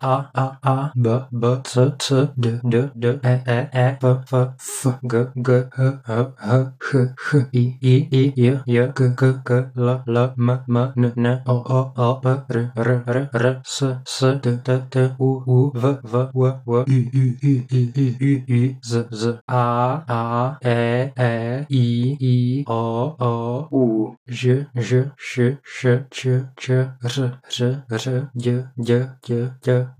Speech > Solo speech
Me speaking the letters of the alphabet plus various other phonemes, mildly processed, mono only.